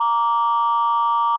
Instrument samples > Synths / Electronic
Landline Phonelike Synth A#6
Holding-Tone, JI, JI-3rd, JI-Third, just-minor-3rd, just-minor-third, Landline, Landline-Holding-Tone, Landline-Phone, Landline-Phonelike-Synth, Landline-Telephone, Landline-Telephone-like-Sound, Old-School-Telephone, Synth, Tone-Plus-386c